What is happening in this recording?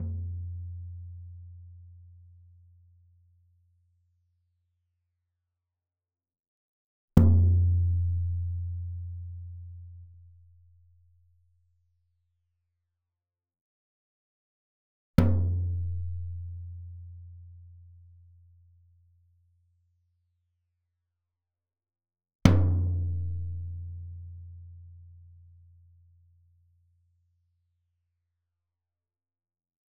Music > Solo percussion
floor tom- Oneshot Velocity Rising Sequence 3 - 16 by 16 inch
floortom recording made in the campus recording studio of Calpoly Humboldt. Recorded with a Beta58 as well as SM57 in Logic and mixed and lightly processed in Reaper